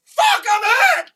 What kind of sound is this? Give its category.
Speech > Solo speech